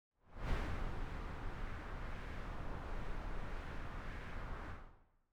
Sound effects > Electronic / Design
This is a sound I created in vital to mimic the displacement of air of a rock flaling from above.
Falling, Whoosh